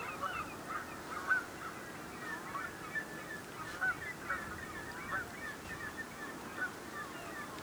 Soundscapes > Nature
Birds on the coast 3
Tascam DR-60 LOM Uši Pro (pair)